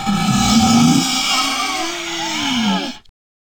Sound effects > Experimental
Creature Monster Alien Vocal FX (part 2)-059
A collection of alien creature monster sounds made from my voice and some effects processing
Alien, bite, Creature, demon, devil, dripping, fx, gross, grotesque, growl, howl, Monster, mouth, otherworldly, Sfx, snarl, weird, zombie